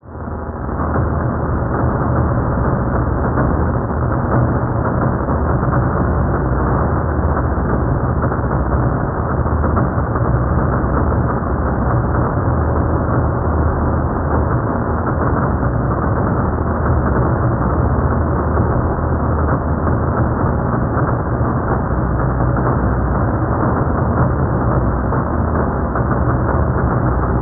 Soundscapes > Urban
IDM Atmosphare5
Synthed with PhasePlant Granular
Noise, Industry, Ambient, Atomsphare, IDM